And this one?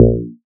Instrument samples > Synths / Electronic
DUCKPLUCK 2 Bb
additive-synthesis, bass, fm-synthesis